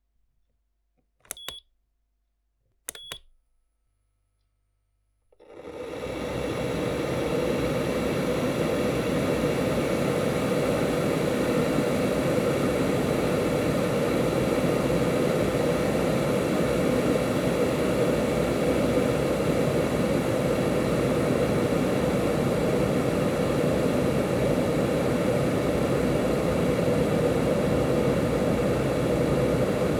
Sound effects > Objects / House appliances
FOODMisc 32bF Modern Kettle Boiling Start to finish with low Freq rumble
sfx, boil, kettle, bubbles, boiling